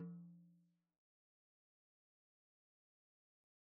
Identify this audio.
Music > Solo percussion
Hi Tom- Oneshots - 17- 10 inch by 8 inch Sonor Force 3007 Maple Rack
flam, velocity, perc, drumkit, acoustic, studio, drum, roll, beat, percs, tomdrum, rimshot, oneshot, instrument, beatloop, kit, tom, beats, toms, hitom, drums, fill, rim, hi-tom, percussion